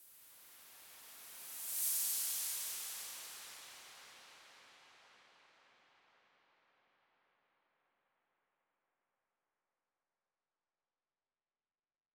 Sound effects > Electronic / Design
Sandy Beach - White Noise - Swoosh SFX
A sandy beach or shore sound effect, made with white noise and a filter on top.
sfx, sound, swoosh, sweep, shore, white, effect, filter, sandy, beach, noise